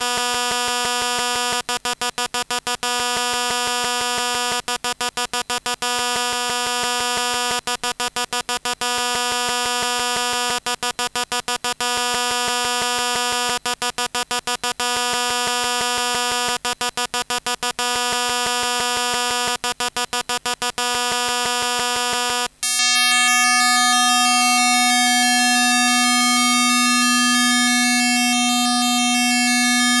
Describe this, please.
Sound effects > Objects / House appliances
Christmas Tree LED Electromagnetic Field Recording #005
Electromagnetic field recording of a Christmas Tree LED (Light Emitting Diode). The pickup coil is placed on top of the LED and it's capturing part of the sequence where LEDs are turned on and off sequentially. At minute #0:22 the LEDs are again changing the intensity gradually. Electromagnetic Field Capture: Electrovision Telephone Pickup Coil AR71814 Audio Recorder: Zoom H1essential